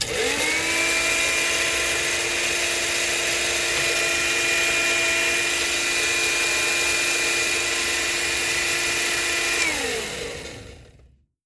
Sound effects > Objects / House appliances
A Hamilton Breach drink master turning on, running at low speed and turning off.